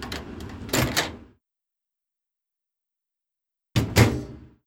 Sound effects > Objects / House appliances
An old 'General Electric' microwave door opening and closing.